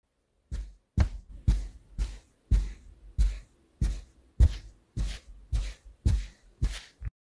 Sound effects > Human sounds and actions
Walking without shoes on the ground ... it´s either ´barefoot walking on a rug´ - or it´s ´walking with socks on a wooden ground´.